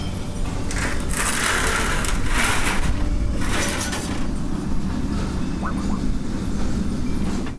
Sound effects > Objects / House appliances
Junkyard Foley and FX Percs (Metal, Clanks, Scrapes, Bangs, Scrap, and Machines) 145
Machine, dumpster, waste, Atmosphere, Percussion, Dump, Bash, SFX, dumping, rattle, tube, scrape, Foley, Environment, Robot, Junkyard, Clang, Metal, rubbish, Smash, Robotic, Ambience, Metallic, garbage, Junk, Clank, FX, Perc, trash, Bang